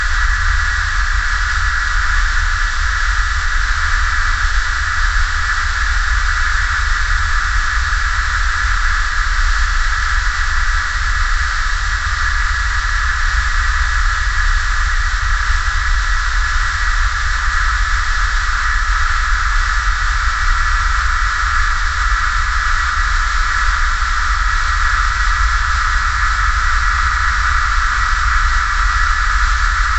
Sound effects > Experimental
"As radio signals turned to static, I could hear the invaders approaching. Not too much longer now and they'll be upon us." For this sound I captured ambient noises in my home using a Zoom H4n multitrack recorder. I then mutated and tinkered with multiple recordings using Audacity, until what you hear in this final piece was produced.